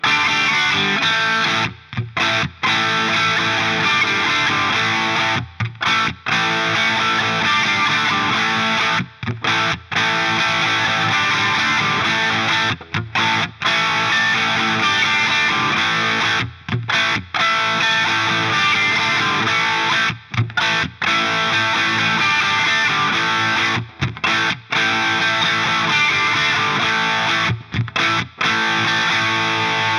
Music > Solo instrument
Guitar riff at 130 bpm
Raw, powerful guitar riffs! These are the true sound of a rocker, not machines. Crafted with a real Fender guitar and AmpliTube 5, you're getting genuine, unadulterated guitar energy. Request anything, available to tour or record anywhere!
guitar, heavy, rock